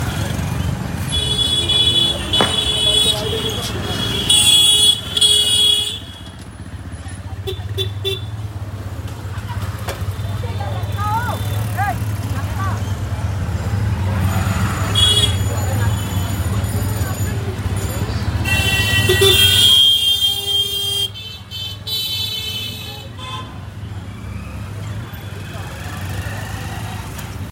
Soundscapes > Urban
Rickshaw traffic noise in Varanasi
01/01/2025 Varanasi Traffic noise recorded from a rickshaw in Varanasi
noise, traffic, varanasi